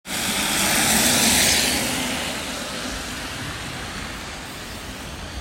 Sound effects > Vehicles
car rain 09
A recording of a car passing by on Insinöörinkatu 30 in the Hervanta area of Tampere. It was collected on November 7th in the afternoon using iPhone 11. There was light rain and the ground was slightly wet. The sound includes the car engine and the noise from the tires on the wet road.